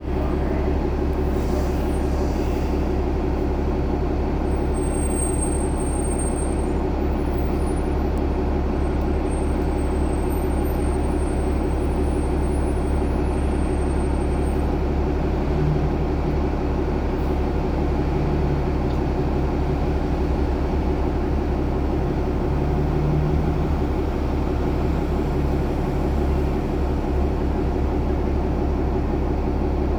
Soundscapes > Urban
Airport Shuttle Bus 900, Idle, Driving on Highway - Toronto, ON
announcement, toronto, bus, night, engine, airport, idle, driving, ambience, city, shuttle, highway, ontario